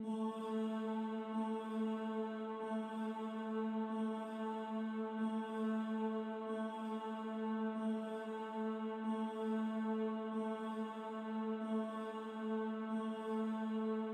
Music > Other
Vocal loop 140bpm Dreamy
Vocal dream loop 140bpm in the background very useful to add in to your production. recorded with a shure sm58 and processed in Ableton live with its own plugins 🔥This sample is free🔥👽 If you enjoy my work, consider showing your support by grabbing me a coffee (or two)!
sounddesign, vocal, 140bpm, dreamy, shoegaze, sample, dreampop